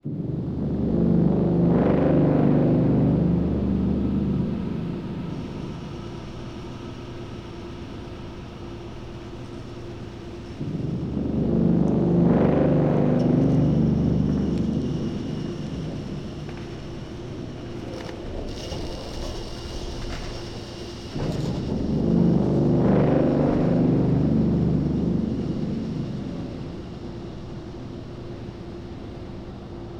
Soundscapes > Synthetic / Artificial
Creepy and dark ambience
A dark horror soundscape I made in FL Studio 2024 by heavily processing sounds I recorded on my Zoom H1n microphone.